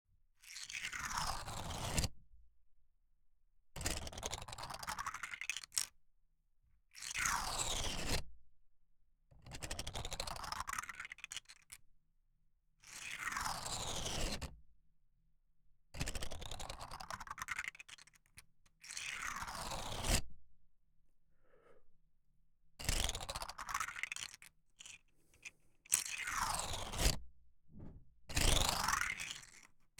Sound effects > Experimental
Sci-Fi Cyberpunk Cyber Robot Environment & Mechanism Sounds 2
Recorded various movements with a 3d-printed fidget toy that just had the most satisfying crunchy set of possible sounds. Part 2.
spaceships, robot, cybertron, tron, cyber, artificial, computer, lasers, cyberpunk, aliens, machine, sci-fi, space, laser-brain, science-fiction, your-mom, scifi